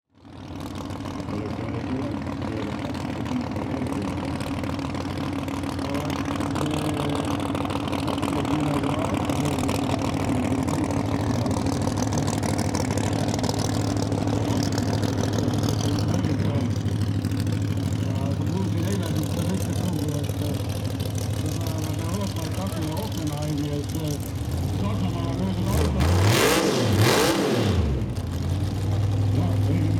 Soundscapes > Other
Wildcards Drag Race 2025
Left: DPA 4055 Kick-Drum Microphone Right: AUDIX D6 Portable audio recorder: Sound Devices MixPre-6 II Wildcards Drag Race, which took place on August 17, 2025, in Estonia, on the grounds of the former military airfield in Klitsi. I carried out several tests with different microphones and various setups. This particular clip was made using one specific configuration.
2025, Drag, Wildcards, Race